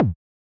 Instrument samples > Percussion
Techno-Analog Kick2
Synthed with 3xOSC only. Processed with Waveshaper, ZL EQ, then tweaked ''Pogo'' amount in FLstudio sampler to make it punchy.
Drum, Analog, EDM, Techno, AcidTechno, Kick